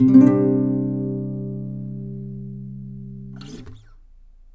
Music > Solo instrument
acoustic guitar pretty chord 1
acosutic guitar chord chords string strings pretty dissonant riff solo instrument slap twang knock
string, strings, dissonant, slap, chords, twang, solo, acosutic, knock, riff, instrument, pretty, guitar, chord